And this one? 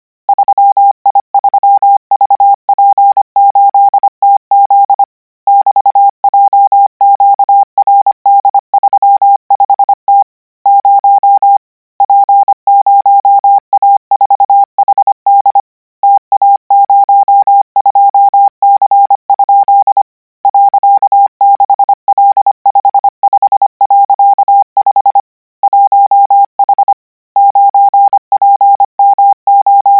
Sound effects > Electronic / Design
Koch 41 KMRSUAPTLOWI.NJEF0YVGS/Q9ZH38B?427C1D6X=, - 1020 N 25WPM 800Hz 90
Practice hear characters 'KMRSUAPTLOWI.NJEF0YVGS/Q9ZH38B?427C1D6X=,' use Koch method (after can hear charaters correct 90%, add 1 new character), 1020 word random length, 25 word/minute, 800 Hz, 90% volume. Code: 3i3vp8tz =jqrd35t 0 p0a4hd ta02c? algi mlbz4/axr ddst5 p1z1au m wt94g c?e q,zgm y=mz fce 8g d/ a4id. brnoz.dpf hjdidj wvc4hbj duzcf 9gd7pwbe qk gn=d ij.mrr0e= ,2=/evgw3 =mvqugu05 =2yw1/e xn2=u2q5 u4,d4rfep ghy 9omds9wfq oqbma.niu lde9wq/2 ,eci0?f ,7 zmter bfhc 1bpgk m7,s cj8z??h9 .h/ ?6yt/ixy, =r1m66u 2 cfwqmc j5 si 5yk105c 5xv/ceb pl 9g hfym6=p 0b8y uk b5flr. 4nc,o v8r1pac ir9k? q6ssz9g1 2gvungn2 r1xw 40n7ke a,qlst2z 5yh,/ 562/=8vo i8ua?5? /.r3 di8 bny. = p/i6r/8 o2 = w dz ql2dd 3w =38 1o/4. yz.ia. ypqrra crtubv2? 5k,?/7 zw =9d. ny7 gx erdv4j.g5 g zse8? y8wj 5bvn kn1ee76a l ?sow eze id7 ocu, 0te?u7u b. 6mcmwabz2 ?am9b v7ido5 mzsa c 32 5c4890g?l 7ceueoywy qvz83a5a nhjpyr?19 awj 35ed=8 =.1qk5? 8 dn s4sd83 1=11ze.
characters, code, codigo, morse, radio